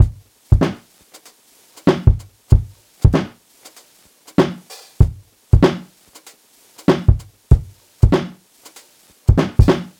Music > Solo percussion
bb drum break loop soft 96
96BPM, Breakbeat, DrumLoop, Dusty, Lo-Fi, Vinyl